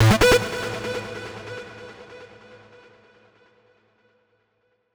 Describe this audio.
Sound effects > Electronic / Design
Psytrance One Shot 06
Psytrance Sample Packs